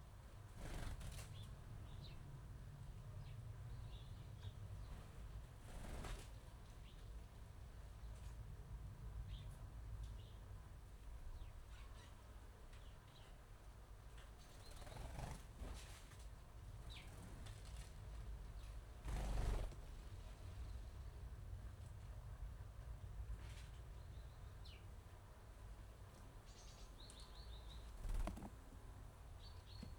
Sound effects > Animals
20250905 18h31 Gergueil - Birdfeeder A-B 1m DJI3
Subject : A A-B 1m wide recording of a bird-feeder in Gergueil. Mics were both about 1m away from feeder but up a tree where the birds hang out. Date YMD : 2025 September 05 around 18h31 Location : Gergueil 21410 Cote d'or France Hardware : DJI mic 3 A-B configuration, internal recording original. Weather : Little wind, some clouds nice temp but on the cold side. Processing : Synced trimmed and normalised in Audacity.
21410, DJI, France, Dji-mic3, bird, flaps, A-B, MIC-3